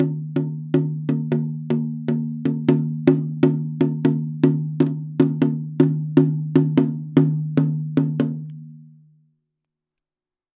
Music > Solo instrument
Toms Misc Perc Hits and Rhythms-015
Crash, Custom, Cymbals, Drums, FX, GONG, Hat, Kit, Metal, Oneshot, Paiste, Perc, Percussion